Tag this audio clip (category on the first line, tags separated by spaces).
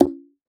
Sound effects > Objects / House appliances
ribbon tone satin-ribbon